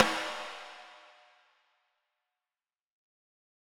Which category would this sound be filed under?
Music > Solo percussion